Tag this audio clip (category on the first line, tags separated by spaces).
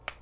Sound effects > Objects / House appliances
foley marker top washable Blue-brand crayola open dry-erase Blue-Snowball